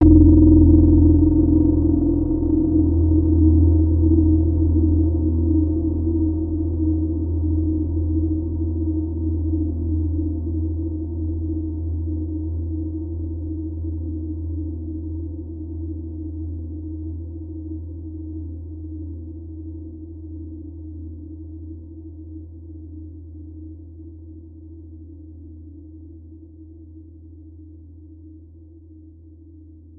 Instrument samples > Synths / Electronic
Synth Ambient One Shot with Vibrations
One shot sample pad created with my Soma Terra
vibration, one-shot, synth, ambient, electronic, pad, experimental